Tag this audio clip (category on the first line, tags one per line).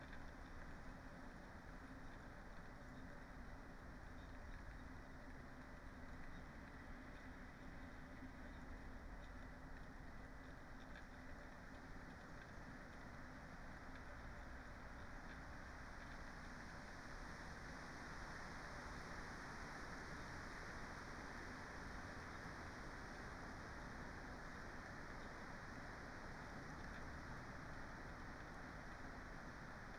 Soundscapes > Nature
data-to-sound
natural-soundscape
field-recording
modified-soundscape
soundscape
raspberry-pi
sound-installation
alice-holt-forest
nature
Dendrophone
phenological-recording
artistic-intervention
weather-data